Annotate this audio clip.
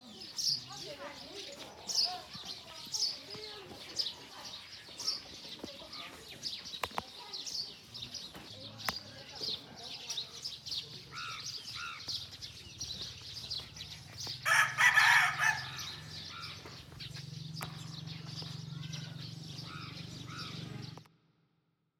Sound effects > Natural elements and explosions
The natural sounds of a village in the morning from the countryside were recorded with an iPad and edited with Studio One DAW. eg: people's voices, birds' voices, the sound of a motorbike driving on the village road, the sounds of walking, the sounds of children.
birds, driving, field-recording, morning, natural, nature, voices, walking